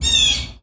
Sound effects > Animals
BIRDCrow-Samsung Galaxy Smartphone, CU Blue Jay Cry, Single, High Pitch Nicholas Judy TDC
A single, high-pitched blue jay cry.